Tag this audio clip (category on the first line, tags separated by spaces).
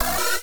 Sound effects > Electronic / Design
Digital alert notification options interface UI menu button